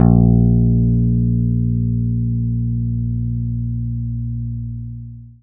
Instrument samples > String
As1 rr1
bass, guitar, instrument, picked, real, riff